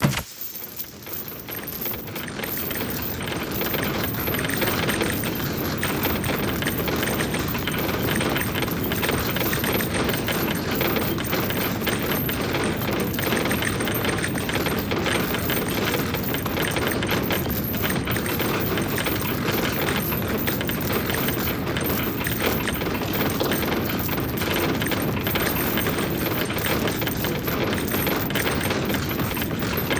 Sound effects > Other mechanisms, engines, machines
Hi! That's not recordedsound :) I synthed it with phasephant!